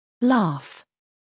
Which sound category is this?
Speech > Solo speech